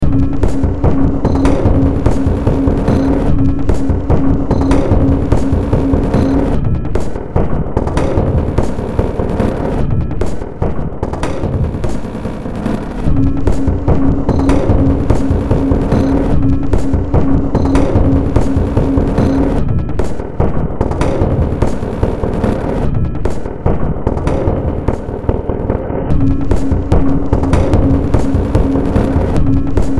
Music > Multiple instruments
Short Track #3241 (Industraumatic)
Ambient, Horror, Industrial, Underground